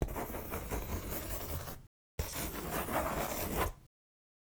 Sound effects > Objects / House appliances
Pencil scribbles/draws/writes/strokes fast.